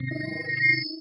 Sound effects > Electronic / Design
Digital UI SFX created using Phaseplant and Portal.
alert, confirmation, digital, interface, message, selection